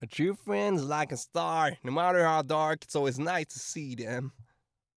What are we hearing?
Speech > Solo speech
Surfer dude - A true friend is like a star 1
Subject : Recording my friend going by OMAT in his van, for a Surfer like voice pack. Date YMD : 2025 August 06 Location : At Vue de tout albi in a van, Albi 81000 Tarn Occitanie France. Shure SM57 with a A2WS windshield. Weather : Sunny and hot, a little windy. Processing : Trimmed, some gain adjustment, tried not to mess too much with it recording to recording. Done inn Audacity. Some fade in/out if a oneshot. Notes : Tips : Script : A true friend is like a star, no matter how dark it gets out there, they're always there and always nice to see them.
philosophical-bro, August, 2025, Cardioid, In-vehicle, 20s, Dude, English-language, France, mid-20s, Tascam, VA, Mono, Voice-acting, RAW, FR-AV2, SM57, Adult, Surfer, A2WS, Male, Single-mic-mono